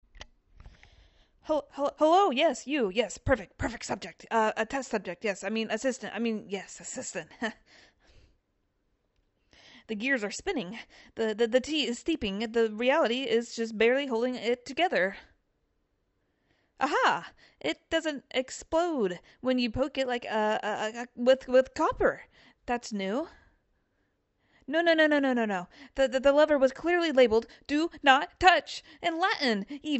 Speech > Solo speech
Character Voice Pack: Eccentric Inventor (fast-talking / chaotic genius / cartoon energy)
A high-energy, mad genius-style voice pack full of speed, surprises, and wild creativity—great for fantasy tech worlds or zany animations. 1. Greeting / Introduction “HELLO! Yes! You—perfect test subject! I mean... assistant! Yes. Assistant!” 2. Idle / Casual Line “The gears are spinning, the tea is steeping, and reality is just barely holding it together!” 3. Excited Discovery “Ah-HA! It doesn’t explode when you poke it with copper! That’s… new!” 4. Frustrated / Ranting “No no no! That lever was clearly labeled DO NOT TOUCH—in Latin, even!” 5. Shocked / Scared “Oh dear. That’s either a dimensional rift or someone left the oven on.” 6. Happy / Triumphant “It works! IT WORKS! I mean, sure, the cat’s invisible now, but still—progress!”
scripts, eccentricnpc, inventorvoice, Character, chaoticcharacter, voiceover